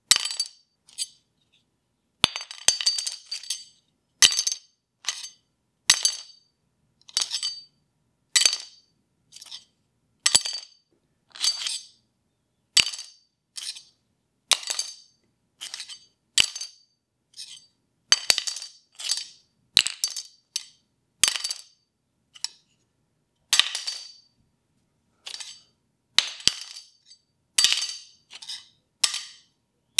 Sound effects > Other
Small Metal Object Falling
Small knife/metal object falling and scraping against concrete.
clang, clatter, dagger, knife, scrape, steel, sword